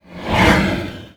Sound effects > Electronic / Design
Dragon car breath SFX
A car passing by while my friend and I were recording in a truck. This has been reversed and faded in/out. SM57 with A2WS windcover. Edited in audacity.
dragon, edited, breath, exhaling, FR-AV2, car, sm57, Shure